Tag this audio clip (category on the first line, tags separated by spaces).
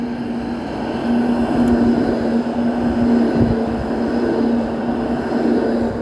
Sound effects > Vehicles
tram Tampere moderate-speed embedded-track passing-by